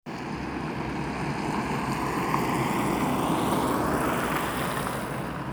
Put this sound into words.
Soundscapes > Urban
voice 10 14-11-2025 car
What: Car passing by sound Where: in Hervanta, Tampere on a cloudy day Recording device: samsung s24 ultra Purpose: School project